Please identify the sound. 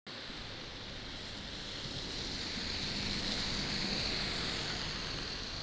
Sound effects > Vehicles
tampere bus13
vehicle, bus, transportation